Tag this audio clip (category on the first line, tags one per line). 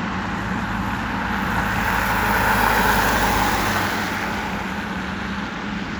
Soundscapes > Urban
Drive-by
field-recording